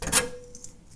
Sound effects > Other mechanisms, engines, machines
Handsaw Oneshot Hit Stab Metal Foley 9
vibe, perc, saw, metallic, smack, fx, percussion, handsaw, hit, tool, twangy, vibration, metal, plank, twang, foley, shop, sfx, household